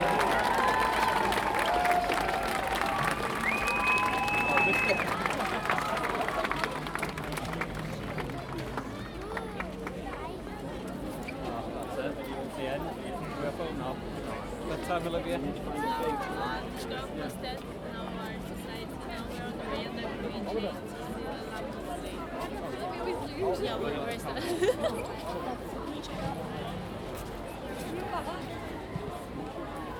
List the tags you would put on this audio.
Sound effects > Human sounds and actions
PEOPLE CROWD VOICES LEEDS CHATTER CITY

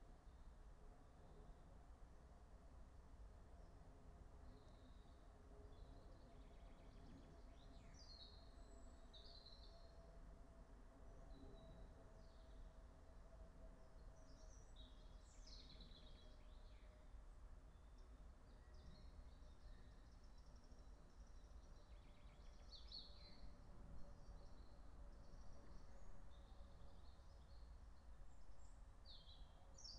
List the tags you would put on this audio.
Soundscapes > Nature
phenological-recording soundscape meadow raspberry-pi natural-soundscape nature field-recording alice-holt-forest

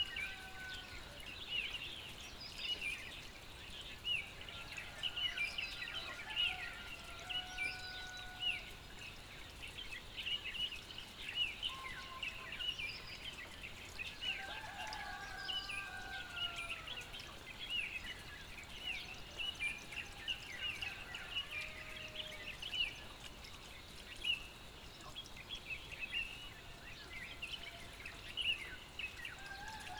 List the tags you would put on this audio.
Soundscapes > Nature
background birds insects atmosphere dawn soundscape spring countryside recording car rooster rural field-recording raindrop rain calm water outdoor village nature rainy ambient outside morning

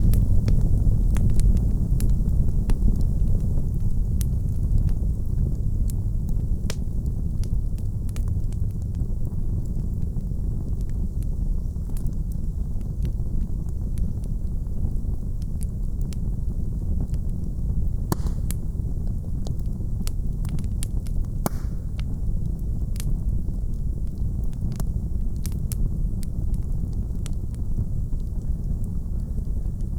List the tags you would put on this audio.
Soundscapes > Urban
fire fire-pit-loop small-fire

